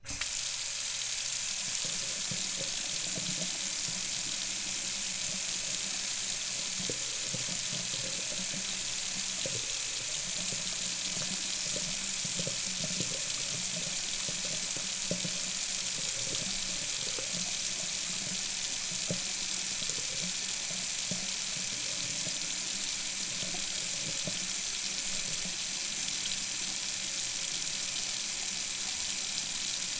Sound effects > Objects / House appliances
WATRPlmb-Tascam DR05, CU Sink, Turn On, Run, Off Nicholas Judy TDC
A sink turning on, running and turning off.
sink, turn-off, turn-on, run, Phone-recording